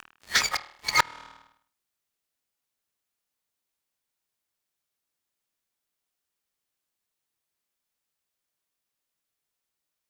Sound effects > Experimental
FX Glitch ONESHOT REVvvvvrt
a strange glitchy scrape fx
abstract, alien, aliens, electronic, experimental, freaky, future, fx, glitch, glitchy, metal, metallic, noise, otherworldly, sci-fi, scifi, sfx, sound-design, sounddesign, soundeffect, strange, weird, wtf